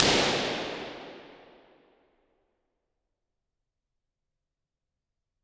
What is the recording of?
Soundscapes > Other
I&R Esperaza's church - Mic at entrence Pop at Altar - OKM1
Subject : An Impulse and response (not just the response.) of Esperaza's church. Popping a balloon on the altar side of the church with the mic on the other side last seat row ish. Date YMD : 2025 July 12 daytime Location : Espéraza 11260 Aude France. Recorded with a Soundman OKM1 Weather : Processing : Trimmed in Audacity. Notes : Recorded with both a Superlux ECM 999 and a Soundman OKM-1 Tips : More info in the metadata, such as room size, height of pop and mic.
11260, ballon, balloon, church, convolution, Convolution-reverb, Esperaza, FR-AV2, FRAV2, Impulse, Impulseandresponse, IR, OKM1, omni, other-side, pop, Response, Reverb, Soundman, Tascam